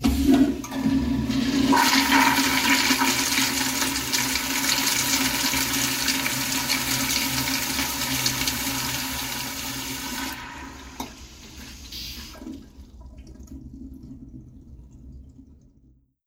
Sound effects > Objects / House appliances
WATRPlmb-Samsung Galaxy Smartphone, CU Toilet, Flush, Short Air Tank Fill Nicholas Judy TDC
A toilet flushing. Recorded at Johnston Willis Hospital.
air-tank, fill, flush, Phone-recording, short, toilet